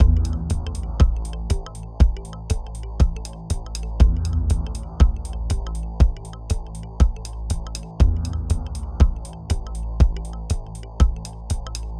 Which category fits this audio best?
Music > Multiple instruments